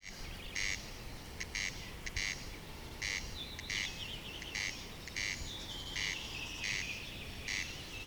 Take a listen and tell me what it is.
Soundscapes > Nature
CORNCRAKE and other night birds 6
corncrake and other night birds recorded with Zoom H1n
birdsong, birds, spring, ambience, field-recording, ambiance, bird, nature, forest, ambient